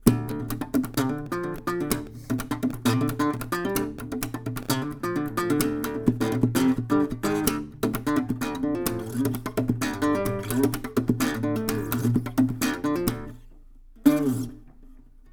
Music > Solo instrument
acoustic guitar slap 6
riff, pretty, chords, guitar, instrument, strings, acosutic, solo, twang, slap, chord, string, knock, dissonant